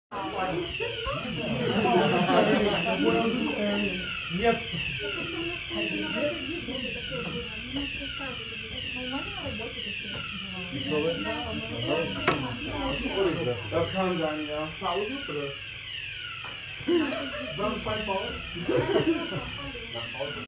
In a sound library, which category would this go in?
Sound effects > Other mechanisms, engines, machines